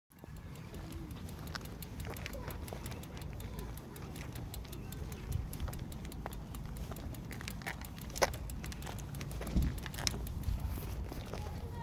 Soundscapes > Urban
bicycle wheels and the outside
Repetative "clicking" of the wheels on the bicycle. Walking steps and voices in the background. Recorded with recording app on phone (oppoA CPH2333)
bicycle, urban